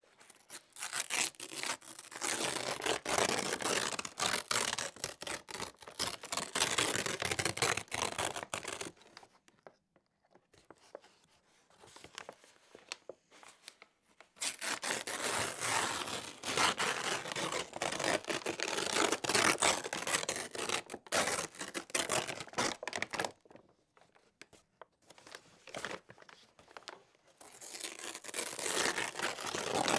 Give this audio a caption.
Sound effects > Objects / House appliances
A recording of paper packaging being ripped by hands. Recorded using Zoom F3. Rode NTG4. Dual Mono.